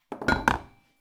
Sound effects > Other mechanisms, engines, machines
Woodshop Foley-104
bang, boom, bop, crackle, fx, little, metal, rustle, sfx, shop, thud, tools